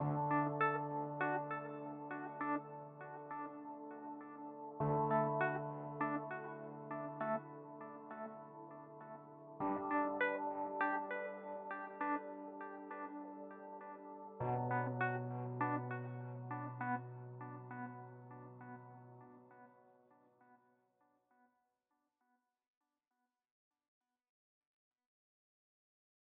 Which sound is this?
Music > Multiple instruments
a short piece of an lofi track, created in ableton, using some rhodes, piano and pluck sounds, keeping it simple and clean